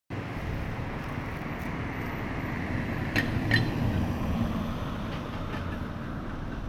Sound effects > Vehicles
Sound recording of a car passing by and driving over a manhole cover. Recording done next to Hervannan valtaväylä, Hervanta, Finland. Sound recorded with OnePlus 13 phone. Sound was recorded to be used as data for a binary sound classifier (classifying between a tram and a car).
Car, Finland, Field-recording